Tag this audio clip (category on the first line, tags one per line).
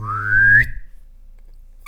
Sound effects > Objects / House appliances
beatbox
blow
bubble
bubbles
foley
mouth
perc
sfx
squeek
whistle